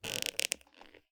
Sound effects > Objects / House appliances
I noticed the material on my shoes had an interesting pleather-y sound to them, so I recorded some of that.
Pleather, Rubbing, Shoes